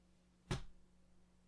Sound effects > Experimental
Napkin Thrown on Table
Throwing down a napkin after an argument.
anger, table, tossing